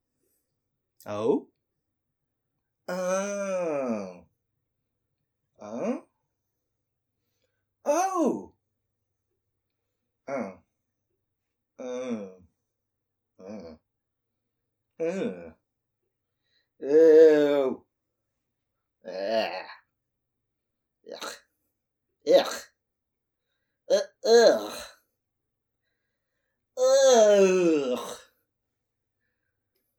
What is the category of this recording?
Speech > Other